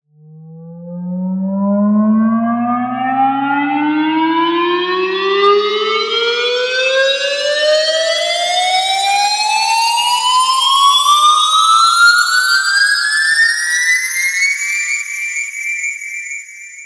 Sound effects > Electronic / Design
Square Riser/Powerup
Made in LMMS using 3xOsc and a sh** ton of effects.
Riser, FX, Powerup, Square-Wave, Effect